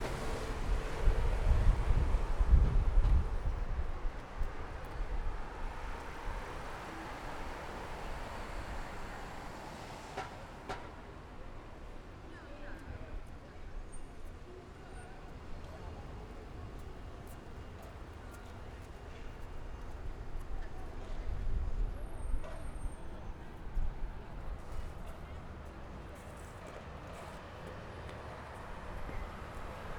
Soundscapes > Urban
LNDN SOUNDS 004
Some sounds from around a slightly busy London neighbourhood, recorded in 2024 with a Zoom H6
ambience, london, street, urban